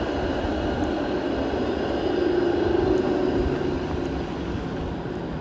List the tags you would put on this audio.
Soundscapes > Urban
tampere vehicle tram

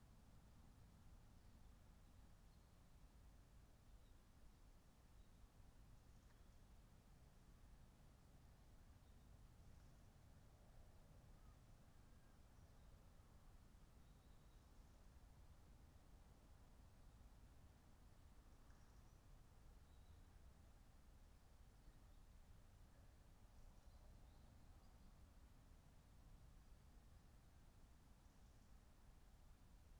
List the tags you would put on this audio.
Soundscapes > Nature
natural-soundscape artistic-intervention soundscape nature modified-soundscape data-to-sound raspberry-pi sound-installation field-recording alice-holt-forest weather-data Dendrophone phenological-recording

